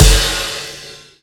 Instrument samples > Percussion
crashkick XWR 13
Stagg, Istanbul, low-pitched, Soultone, sinocrash, Paiste, polycrash, multicrash, bang, metal, Zultan, Sabian, shimmer, sinocymbal, crack, smash, clash, crash, clang, crunch, spock, cymbal, multi-China, Zildjian, Avedis, Meinl, metallic, China